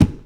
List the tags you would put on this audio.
Sound effects > Objects / House appliances
cleaning
tip
liquid
hollow
foley
bucket
spill

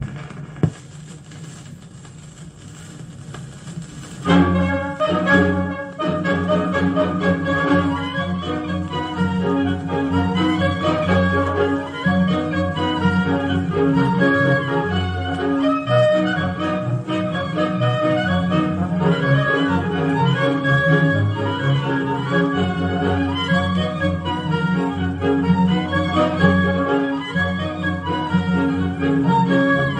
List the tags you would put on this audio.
Music > Multiple instruments
march
music
thai

